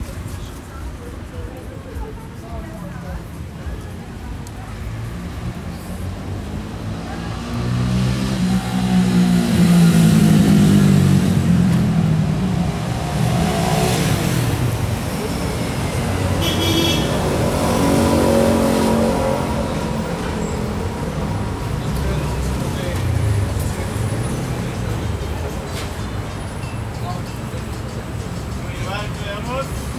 Sound effects > Other
AMBUrbn street voices metrobus cdmx music cars horn ECG FCS2
A not-so-busy street in Mexico City. The Metrobus is playing in the background, a man saying "¿Qué le damos?" and cars but no traffic. There's music.
metrobus, voices, cdmx